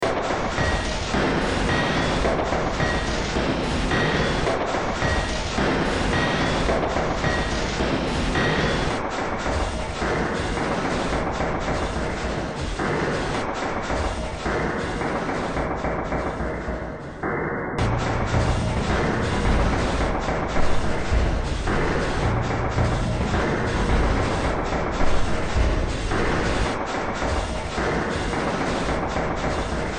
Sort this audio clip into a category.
Music > Multiple instruments